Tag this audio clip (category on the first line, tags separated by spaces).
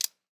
Sound effects > Human sounds and actions
activation button click interface off switch toggle